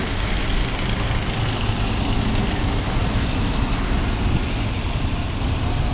Sound effects > Vehicles
A bus stopping by in Tampere, Finland. Recorded with OnePlus Nord 4.
bus, transportation, vehicle